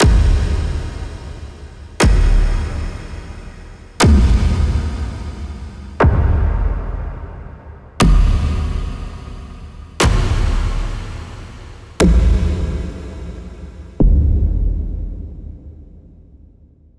Sound effects > Electronic / Design
FX Impact Designer (JH)
sounddesign, sfx, loop, sound-design, boom, explosion, effect, impact, abstract, deep, fx, soundeffect